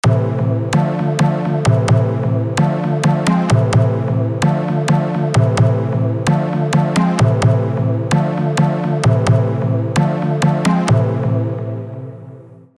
Music > Solo instrument
Ableton Live. VST.Purity......Chord 90s Free Music Slap House Dance EDM Loop Electro Clap Drums Kick Drum Snare Bass Dance Club Psytrance Drumroll Trance Sample .